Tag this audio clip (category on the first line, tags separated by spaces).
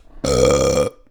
Sound effects > Human sounds and actions
human male burp